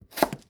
Sound effects > Other
Quick vegetable chop 12
Home, Slice, Chief, Chop, Vegetable, Quick, Kitchen, Knife, Cook, Cooking, Chef